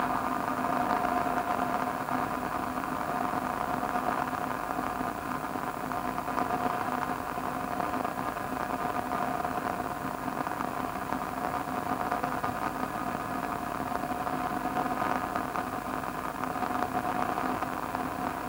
Sound effects > Other
Broken audio head crackle
Sound of a JVC BR-8600E VHS recorder's broken/misaligned audio head that outputs crackling/noise when the tape is in pause mode. Recorded via the BlackmagicDesign Decklink Studio 4K.
hiss, noise, record, dust, surface-noise, linear, crackle, head, VHS, analog